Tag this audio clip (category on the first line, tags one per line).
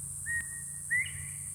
Sound effects > Animals
bird
birds
bobwhite
forest
fowl
gamebird
hunting
quail
woods